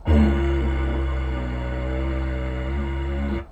Music > Solo instrument
MUSCInst-Blue Snowball Microphone, CU Didgeridoo, Single, Blown Note Nicholas Judy TDC
A single blown didgeridoo note.
blown, Blue-brand, Blue-Snowball, didgeridoo, note, single